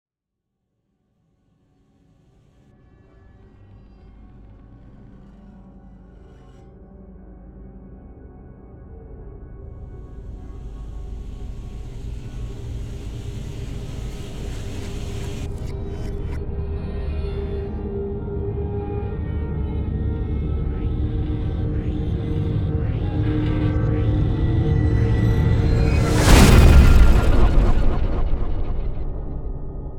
Sound effects > Other
Riser Hit sfx 115
Effects recorded from the field.
bass, cinematic, thud, implosion, industrial, movement, boom, impact, sweep, game, tension, hit, transition, deep, whoosh, stinger, epic, riser, explosion, trailer, sub